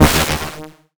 Instrument samples > Synths / Electronic
CINEMABASS 1 Eb
fm-synthesis, bass, additive-synthesis